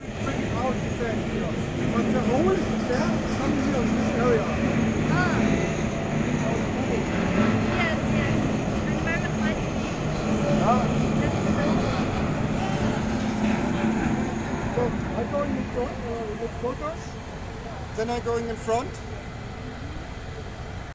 Soundscapes > Urban
Tagebau Welzow-Süd
In a barren land, an excavator rumbles and roars with its mechanical feast.
Field-recordinig, Hum